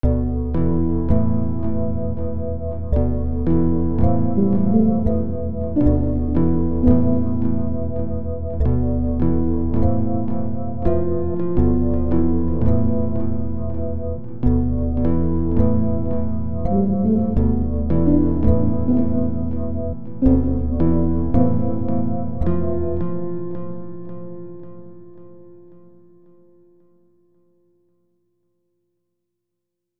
Music > Multiple instruments
Distant Melodies
a chill looming pad melody loop i created using Phase Plant in FL Studio, processed with Reaper